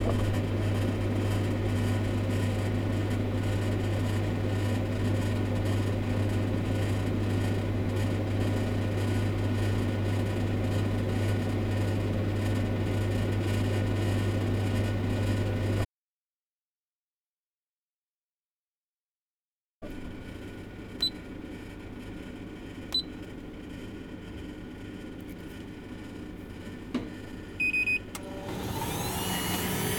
Sound effects > Electronic / Design
Sounds made by a vending machine. The first 20 seconds is the humming sounds of the vending machine. Afterwards is the sound of the vending machine dispensing chocolate milk, and then dispensing a cup of hot water for tea. Recorded this sound effect using a Zoom Audio Recorder H6. Credit isn’t necessary, though obviously appreciated if possible.